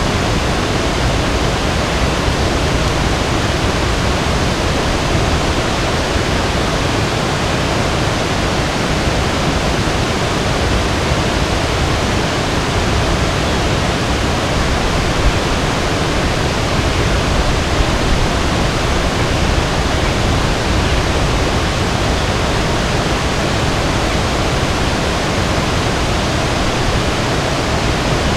Sound effects > Natural elements and explosions
250629 Albi Pontvieux Dam
Subject : Recording the dam from the old bridge "pont vieux". Date YMD : 2025 June 29 Sunday Morning (07h30-08h30) Location : Albi 81000 Tarn Occitanie France. Sennheiser MKE600 with stock windcover P48, no filter. Weather : Sunny no wind/cloud. Processing : Trimmed in Audacity. Notes : There’s “Pause Guitare” being installed. So you may hear construction work in the background. Tips : With the handheld nature of it all. You may want to add a HPF even if only 30-40hz.